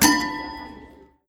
Music > Solo instrument
MUSCToy-Samsung Galaxy Smartphone, CU Piano, Discordant Note, Anime Nicholas Judy TDC
A discordant note being played on a toy piano. Anime. Recorded at Goodwill.
discordant, note